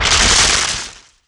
Sound effects > Natural elements and explosions
LoFi FireIgnition-03
Lofi non-explosive ignition sound of a match or gas fire. Foley emulation using wavetable synthesis and noise tables.
activate, burn, burning, candle, crackle, fire, flame, ignite, ignition, match, start, stove